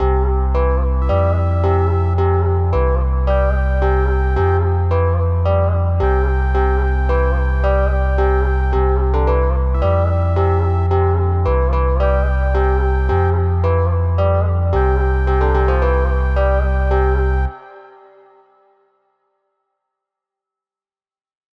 Music > Multiple instruments
chill funky kinda loop 110BPM
A chill funky kinda melody loop. This was made by me in fl studio. Using Twin 3 board organ and ethnic pluck preset for the melody. On the background it has twin 3 bgrand playing along with a free vital sub bass.